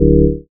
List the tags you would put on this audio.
Instrument samples > Synths / Electronic
bass
additive-synthesis
fm-synthesis

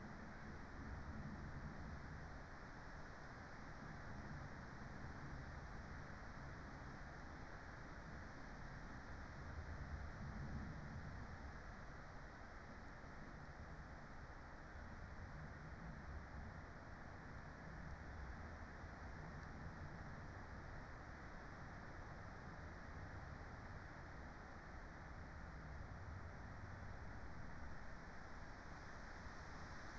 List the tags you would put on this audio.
Soundscapes > Nature
sound-installation
data-to-sound
phenological-recording
natural-soundscape
Dendrophone
soundscape
raspberry-pi
nature
weather-data
alice-holt-forest
field-recording
artistic-intervention
modified-soundscape

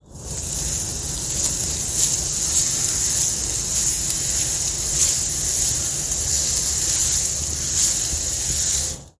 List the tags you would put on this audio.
Sound effects > Natural elements and explosions
Phone-recording
body
human
tree
foley
grass
drag

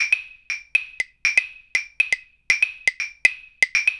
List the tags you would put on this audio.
Music > Solo percussion
120BPM
clave
claves
drum
drums
loop
loops
pack

